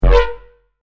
Instrument samples > Synths / Electronic
Smilla Bass (E)
Synth bass made from scratch Key: E I think.....